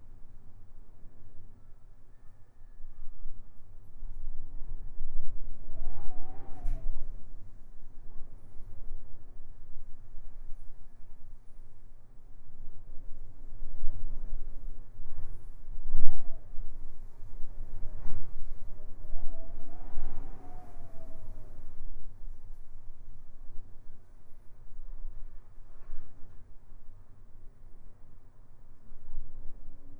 Soundscapes > Nature
Whistling wind
The haunting sound of wind whistling through the gap in a door. Recorded in October 2011 using an Edirol R-09HR field recorder.